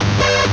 Sound effects > Electronic / Design
RGS-Glitch OneShot 2
Randomly modulated with phaseplant only. Processed with OTT, ZL EQ.
Digital, Effect, FX, Glitch